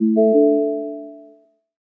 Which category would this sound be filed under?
Sound effects > Electronic / Design